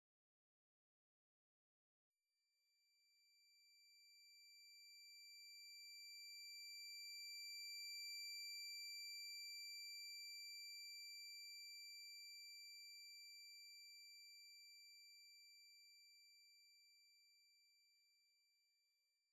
Sound effects > Electronic / Design
A high-pitched squeaking sound, resembling tinnitus effect, created using a synthesizer.
atmospheric, sounddesign, earring, highfrequency, tinnitus, ingingsound, horror, soundeffect, highpitch, Long, earwhistle